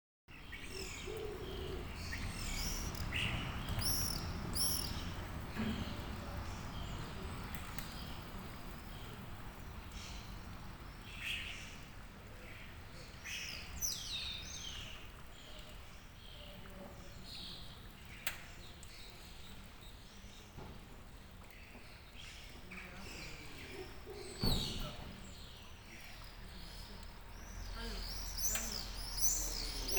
Soundscapes > Urban
20250521 Castelbuono morning pt2
recorded with an Oppo A9 mobile phone between 7:20 and 7:30 a.m. in Piazza Margherita in Castelbuono (PA) on 22 March 2025. The chirping of swallows can be heard. The cries of blackbirds, pigeons and other birds. A few cars pass through the square. One hears the voices of passers-by in the distance. Some laughter of young people passing by. Meowing of stray cats. Employees of the bakery overlooking the square move chairs and tables.
square, italy, birds, early, fountain, morning, freesound20, town